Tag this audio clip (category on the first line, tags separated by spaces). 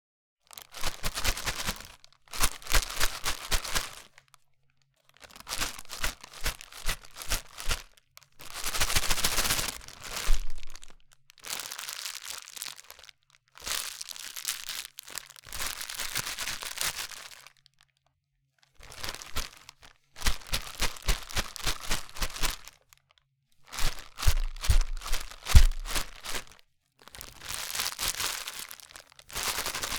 Sound effects > Objects / House appliances
bag candy container crinkle foley plastic shake snack twist wrap wrapper wrapping